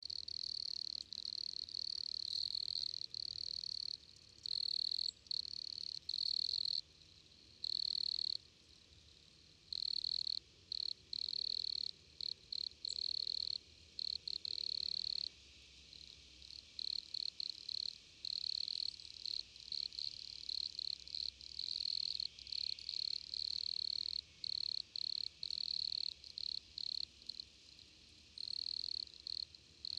Soundscapes > Nature
cricket nemobius sylverstris
2 crickets "nemobius sylvestris" , close up. Recoded with stereo parabol, 1 meters from the crickets.